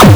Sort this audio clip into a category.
Instrument samples > Percussion